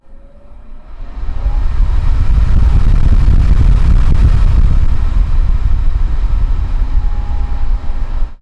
Sound effects > Objects / House appliances
recorded with a zoom box mic.
humidifier, humming, burst